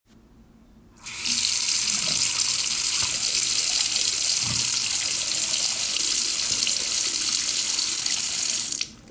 Sound effects > Objects / House appliances
Water running from the open tap.